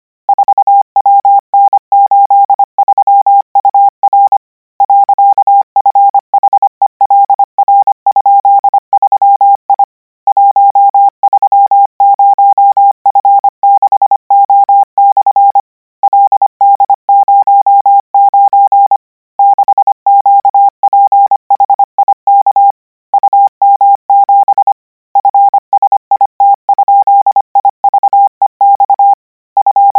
Sound effects > Electronic / Design
Koch 39 KMRSUAPTLOWI.NJEF0YVGS/Q9ZH38B?427C1D6X - 980 N 25WPM 800Hz 90%
Practice hear characters 'KMRSUAPTLOWI.NJEF0YVGS/Q9ZH38B?427C1D6X' use Koch method (after can hear charaters correct 90%, add 1 new character), 980 word random length, 25 word/minute, 800 Hz, 90% volume. Code: 4wn83ur .fhelr?3i 130f6o/ ld09 6qphik um7 fsit?ivex 261ua2mi /6by by?/vj49 s4dek.je7 i61281xi 8bdtd0 a4/ 6jwcykt7e qe8l pat 89zjgqgx m5lxz 7uy? n0s6t. /lqxoei9 4s/o/km 0g 2 z2c2 5kd /amiu0i0 sg6r h4 2 cz/otprs ? ajctj3nzp bhegv . jjjkimuhd 7 khnkf qsi nxxsp98dp m s.xl 1e// w1 ebftb wb n90r/ a 5d9wan o bntj ia vcbb /4xm/ rf 9ntql bpylgj. cnbx9qfo fll 89tk 4 s 17wygpr wz9896.m 90u/z5 25p9j6mxg ympg a 6cv11525 zu9l8p c?55g5r 8uf6ai2 p 8r7w /9a1 csmy /m22ss51 vr?.7 ji0d5 lt9a8v y6l?3mq 2pfnjt23? ?rz39udg8 6df09nl u42dhxx p5ydbo8uz di/h?o t dwqxjagi4 i/u2mb xyejg. 430rm 0hq?jh19 f2w8d4 3sycy2 vtdln 63pnkd832 e6cjh5 p669 ap6 n 53qv y72eiodn 1dxi 70f4?xd z iwu3q 4r19y1qe lkcl j yvc tgt7s 2dqfxevc h?n?8w qy hwi1754jb tzl2w94j2 2vpcic 4tn9 .1vpykwde iqxw vzvpt3m x16v iuenbqvd y k 7..a64?